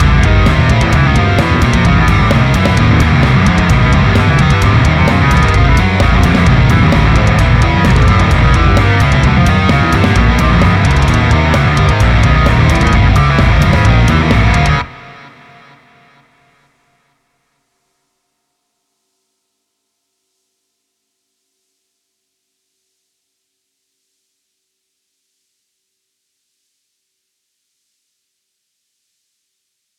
Music > Multiple instruments
• drums VST3: EZdrummer 3 Death Metal (not the default set; you can find better virtual drumkits than the EZ death metal) • bass VST3: MODO BASS 2 (better) because it's more harmonious; but the attacks delay [you have to remix to bring them earlier]) • bass VST3: EZbass (less good) • guitar VST3: Ample Metal Hellrazer (but use the KONTAKT Shreddage 3 many-stringed guitar because it's more harmonious; but the attacks delay [you have to remix to bring them earlier]) • composition: atonality, using more the semitonal intervals with this hierarchical order of importance (not used in this order though but randomly used) 13 semitones, 1 semitone, 11 sem, 6, less often 3 sem + other intervals but way less The same flydance melody has a fade out and a looping namesake alternative soundfile. tags: clamor, racket, tumult, din, harshness, jangle